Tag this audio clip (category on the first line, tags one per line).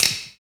Instrument samples > Percussion
1lovewav
1-shot
click
drum
drums
kit
percussion
sample
snap